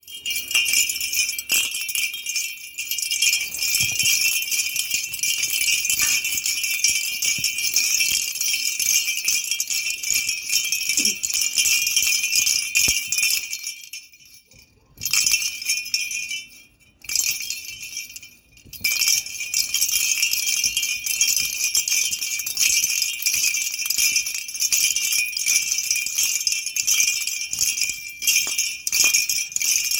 Music > Solo percussion
MUSCBell-Samsung Galaxy Smartphone, CU Sleigh Bells, Big, Ringing Nicholas Judy TDC
Big sleigh bells ringing.
big, sleigh, bells, ring